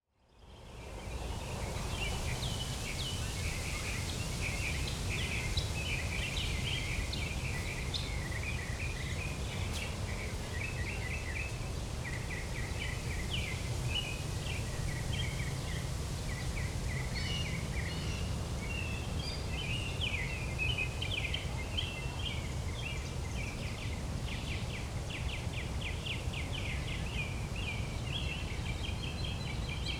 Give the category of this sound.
Sound effects > Natural elements and explosions